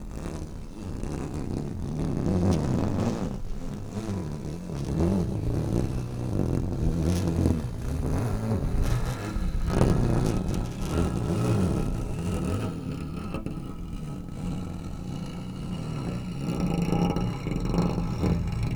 Sound effects > Objects / House appliances
Scratching the Guitar Pick along Guitar String
The sound of the guitar pick scratching along the guitar strings Recorded with Zoom Essential h1n